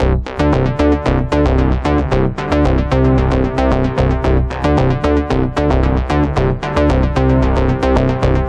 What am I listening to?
Music > Solo instrument
113 G MC202Delay 03
Synth/bass loops made with Roland MC-202 analog synth (1983)
113bpm, 80s, Analog, Analogue, Bass, BassSynth, Electronic, Loop, Roland, Synth, SynthBass, Synthesizer, Vintage